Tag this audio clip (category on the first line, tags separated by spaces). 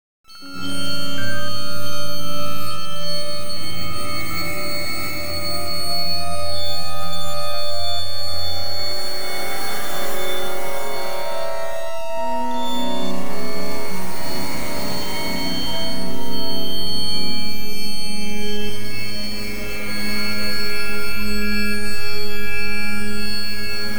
Soundscapes > Synthetic / Artificial
electronic experimental free glitch granulator noise packs sample samples sfx sound-desing sound-effects soundscapes video-game-design